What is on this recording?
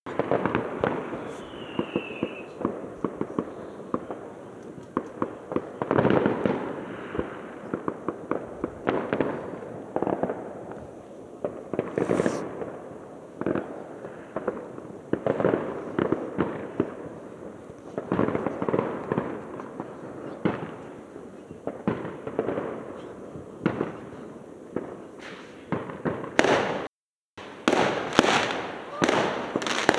Soundscapes > Urban
Fireworks going off. Recorded with my phone.
rocket, explosion, firework, pop, bang, fireworks